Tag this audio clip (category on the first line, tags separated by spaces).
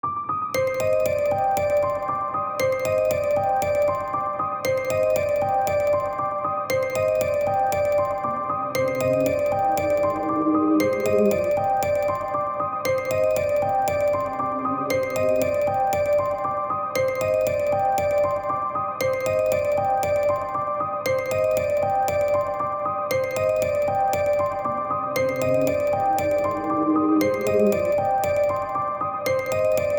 Music > Multiple instruments
music box bpm reverse 117 nexusMelody